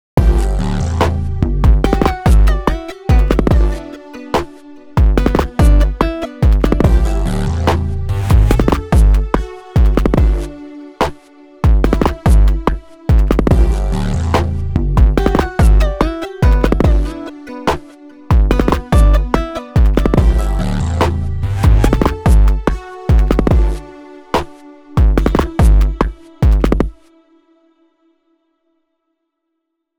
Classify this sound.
Music > Multiple instruments